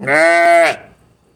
Sound effects > Animals
A sheep bleating close up.